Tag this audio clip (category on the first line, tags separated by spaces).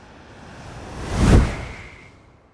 Sound effects > Experimental
distinkt synthetic short